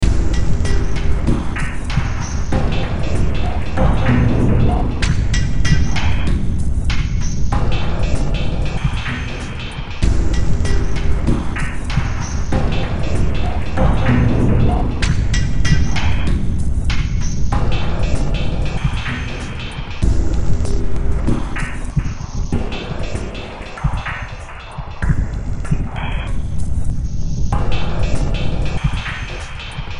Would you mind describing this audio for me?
Music > Multiple instruments
Demo Track #3472 (Industraumatic)
Sci-fi, Horror, Ambient, Noise, Industrial, Cyberpunk, Games, Underground, Soundtrack